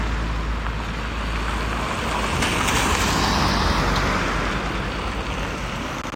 Sound effects > Vehicles
Car driving 15
Car sound recorded outdoors in Hervanta, Tampere using an iPhone 14 Pro. Recorded near a city street on a wet surface for a university vehicle sound classification project.